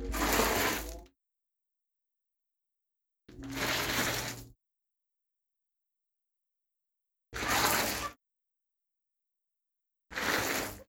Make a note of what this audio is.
Sound effects > Human sounds and actions
FOLYFeet-Samsung Galaxy Smartphone, MCU Skids, Rocky Road Nicholas Judy TDC
Feet skids on rocky road. Recorded at Hanover Pines Christmas Tree Farm.
skid, rocky, Phone-recording, foley, feet, road